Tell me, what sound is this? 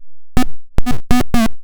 Sound effects > Electronic / Design
Optical Theremin 6 Osc dry-034
Alien, Glitchy, Synth, FX, Electro, Robot, Glitch, Optical, Experimental, Handmadeelectronic, Dub, Theremin, Robotic, Bass, Electronic, Sweep, Otherworldly, Spacey, Scifi, Noise, Digital, Analog, SFX, Theremins, Trippy, noisey, Instrument, Sci-fi, DIY, Infiltrator